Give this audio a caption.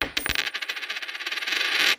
Sound effects > Objects / House appliances
OBJCoin-Samsung Galaxy Smartphone, CU Quarter, Drop, Spin 08 Nicholas Judy TDC
drop, foley, Phone-recording, quarter, spin